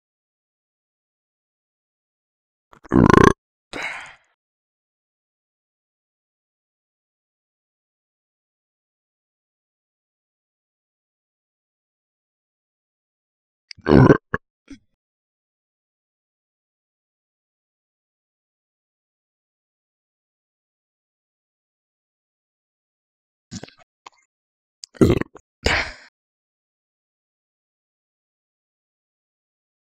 Sound effects > Human sounds and actions
These are the sounds of me burping that I recorded. I recorded these sound effects and ensured there was no background noise.